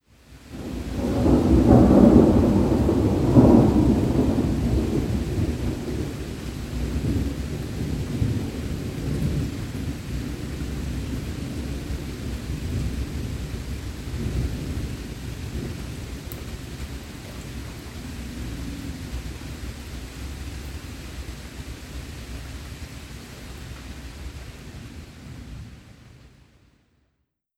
Sound effects > Natural elements and explosions
THUN-Samsung Galaxy Smartphone, CU Boom, Big, Rumble, Distant Static Like Rain Nicholas Judy TDC
A big thunder boom, then rumble with distant static-like rain.
big, boom, distant, Phone-recording, rain, rumble, static, thunder